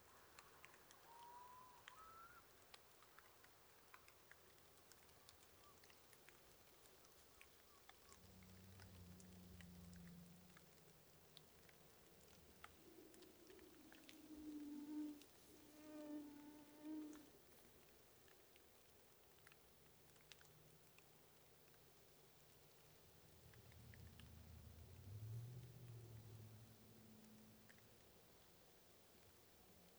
Soundscapes > Nature
Recording in light rain, accompanied by a mosquito that found my microphone to be interesting. Tascam DR-60 Rode NTR3